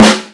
Instrument samples > Percussion
snare zinzan dist 1
Trick, jazz, Tama, Ludwig, DW, Canopus, drums, British, deathsnare, Pearl, Sonor, Spaun, AF, Mapex, PDP, Premier, CC, beat, snare, Yamaha, mainsnare, death-metal, Noble-Cooley, drum, Craviotto, Gretsch, percussion, Pork-Pie, Slingerland